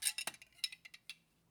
Sound effects > Objects / House appliances
knives handling1
cutlery, knives, silverware